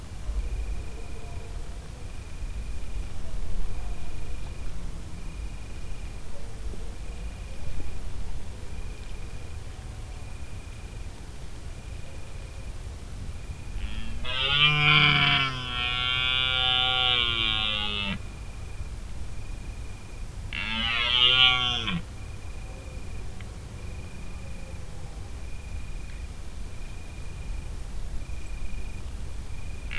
Sound effects > Animals
250910 22h42 Gergueil D104 - Brame
Subject : Stag bellowing in Gergueil. Sennheiser MKE600 with stock windcover. P48, no filter. A manfroto monopod was used. Weather : Processing : Trimmed and normalised in Audacity. Notes : Electric poles were close. So there's a slight buzz :/ Other spots we tried didn't have much activity or too much wind.
Bourgogne-Franche-Comte, Hypercardioid, Tascam, countryside, bellow, Sennheiser, Single-mic-mono, France, FR-AV2, 21410, brame, stag, deer, Gergueil, Shotgun-microphone, Shotgun-mic, Cote-dOr, rural, Bourgogne, MKE-600, roar, country-side, bellowing, MKE600